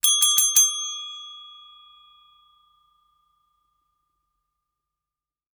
Sound effects > Objects / House appliances
Ring the receptionist's bell four times
Bell, call, chime, counter, hall, motel